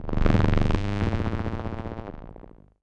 Sound effects > Experimental
Analog Bass, Sweeps, and FX-143
basses, complex, robot, analogue, snythesizer, weird, machine, sample, analog, oneshot, electro, robotic, effect, sci-fi, pad, alien, vintage, sfx, mechanical, bass, fx, dark, bassy, trippy, electronic, korg, sweep, retro, synth, scifi